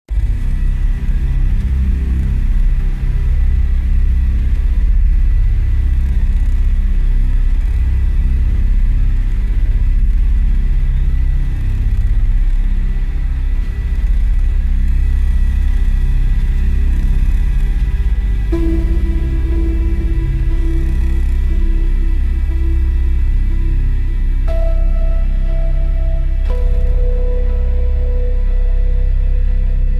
Music > Multiple instruments
Track for the title screen of the horror text adventure game: "Chromatose."